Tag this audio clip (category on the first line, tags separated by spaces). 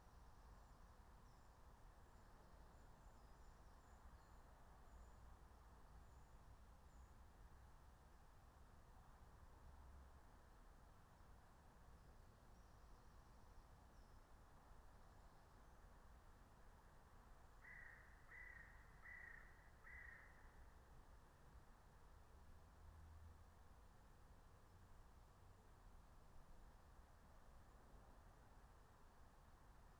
Soundscapes > Nature
phenological-recording field-recording alice-holt-forest soundscape nature meadow raspberry-pi natural-soundscape